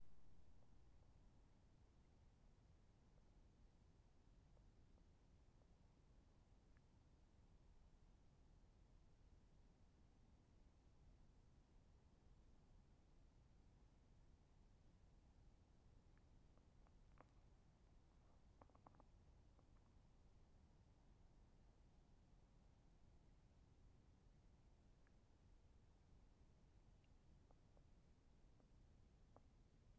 Soundscapes > Nature
STeDe still water 11.23am
Recorded with zoom H1 essential